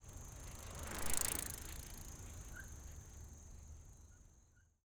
Sound effects > Other mechanisms, engines, machines
bicycle rideby stereo 02
Ride by of an old Panasonic (!) 10 speed coasting down a roughly paved road. Recorded by a Tascam X8 in stereo 1 foot off the ground pointing at the pedals; so the bike pans from one side to the other as it passes. This sample has more tire sound.
chain, wheel, bike